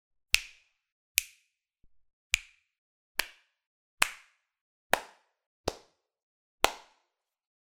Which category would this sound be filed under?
Sound effects > Human sounds and actions